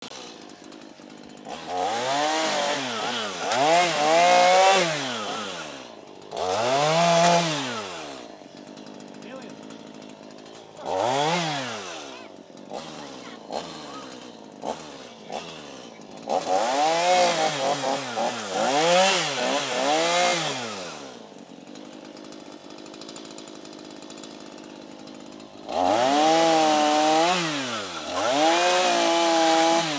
Sound effects > Other mechanisms, engines, machines
A small chainsaw cutting down overgrown bushes. Rode NTG-3 (with Rycote fuzzy) into Sound Devices MixPre6. Recorded June 2nd, 2025, in Northern Illinois.